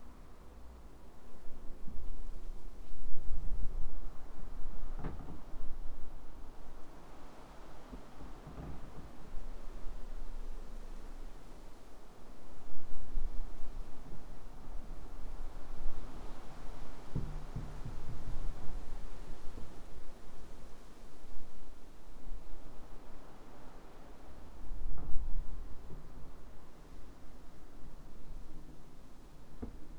Soundscapes > Urban
Windy Night With Knocking Fence Panels
Continuous wind with intermittent low-frequency fence knocks and creaks. Natural dynamic range, minimal background hum, ideal for layering or environmental ambience. Please enjoy the sound :)
ambiance, ambience, ambient, atmos, atmosphere, atmospheric, background, background-sound, creaking, fence, field-recording, garden, general-noise, knocking, moody, natural, night, outdoor, sfx, soundscape, storm, white-noise, wind, windy, wood